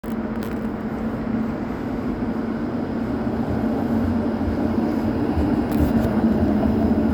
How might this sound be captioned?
Sound effects > Vehicles
27tram passingintown
A tram is passing by in the city center of Tampere. Recorded on a samsung phone.